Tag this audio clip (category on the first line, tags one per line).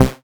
Instrument samples > Synths / Electronic
bass
fm-synthesis